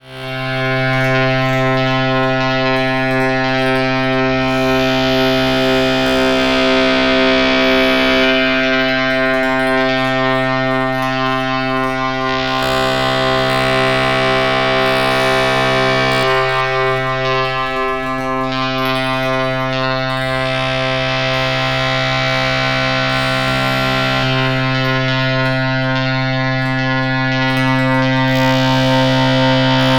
Sound effects > Electronic / Design
Detuned Electromagnetic Noise with Elastic Combo Filter Loop 120 bpm #002
120bpm, electrical, field-recording, electromagnetic, electric, noise, loop, magnetic, 120-bpm, field